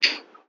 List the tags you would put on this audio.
Sound effects > Objects / House appliances
acoustic button snare switch lightswitch click switches light flip flick percussion drum off switching

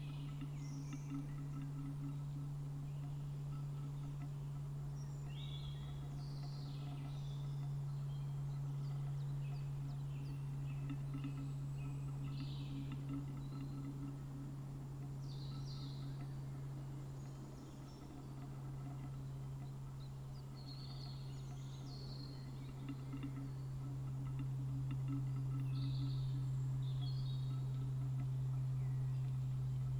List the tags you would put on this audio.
Soundscapes > Nature
data-to-sound nature raspberry-pi weather-data

